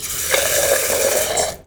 Sound effects > Objects / House appliances
sink running
Recorded on a zoom recorder. The sound of a faucet turning on and water flowing into the sink.
flowing, liquid, sink, stream, water